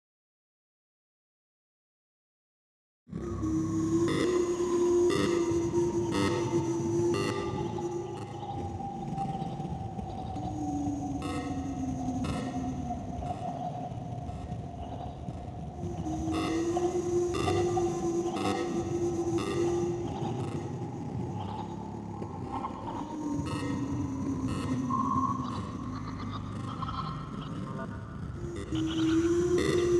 Music > Other
This is created with the iPad app SoundScaper by Igor Vasiliev.